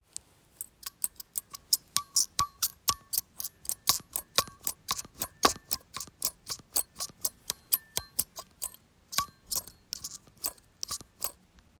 Sound effects > Objects / House appliances
matu sprādzes eņģes / hinge of hair clip
Household objects for sound recognition game
household, item